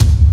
Sound effects > Natural elements and explosions
bang start 1
Only the beginning of an explosion. It's good as a floortom 1, but it needs a better attack.